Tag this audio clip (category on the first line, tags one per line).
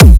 Instrument samples > Synths / Electronic
bassdrum
basedrum
perc
one-shot
bass-drum
drums
kick-drum